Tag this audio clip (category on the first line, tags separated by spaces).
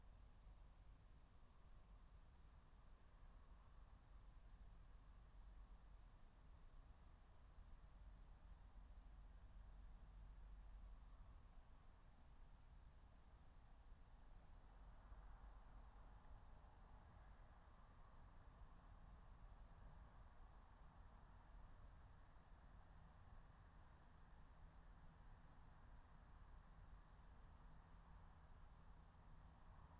Soundscapes > Nature
phenological-recording nature alice-holt-forest raspberry-pi field-recording soundscape natural-soundscape meadow